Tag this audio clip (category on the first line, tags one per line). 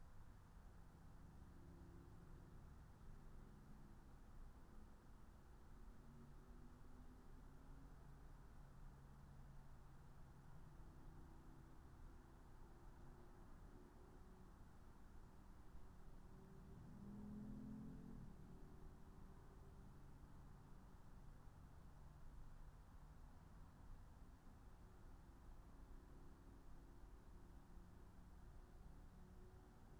Soundscapes > Nature
phenological-recording
raspberry-pi
soundscape
natural-soundscape
alice-holt-forest
meadow
nature
field-recording